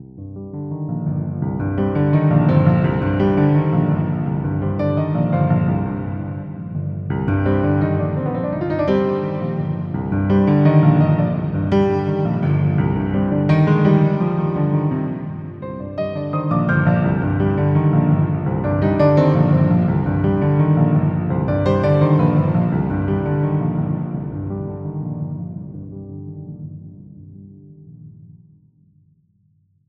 Music > Solo instrument
A piano melody and bass line that I wrote, recorded in my studio with FL Studio using Pigments and processed through reaper